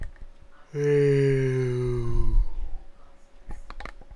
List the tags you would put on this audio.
Sound effects > Human sounds and actions
Sleepy Human Yawn

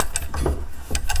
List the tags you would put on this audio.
Sound effects > Other mechanisms, engines, machines
bam bang boom bop crackle foley fx knock little metal oneshot perc percussion pop rustle sfx shop sound strike thud tink tools wood

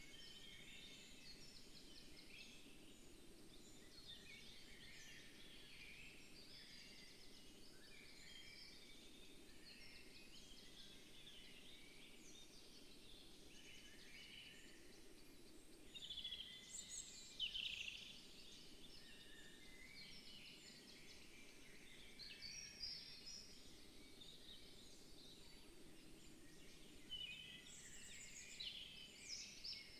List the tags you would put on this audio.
Soundscapes > Nature
phenological-recording alice-holt-forest modified-soundscape soundscape raspberry-pi Dendrophone artistic-intervention natural-soundscape nature sound-installation weather-data data-to-sound field-recording

